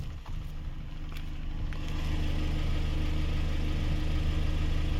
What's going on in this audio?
Sound effects > Other mechanisms, engines, machines
clip auto (6)
Auto, Toyota